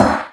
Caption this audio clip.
Instrument samples > Percussion
I have many alternative versions in my crash folder. A blendfile of low-pitched crashes, a gong and a ride with the intent to be used as an audible crash in rock/metal/jazz music. Version 1 is almost unusable, except if you build sounds. tags: crash China gong fake artificial synthetic unnatural contrived metal metallic brass bronze cymbals sinocymbal Sinocymbal crashgong gongcrash fakery drum drums Sabian Soultone Stagg Zildjian Zultan low-pitched Meinl smash metallic Istanbul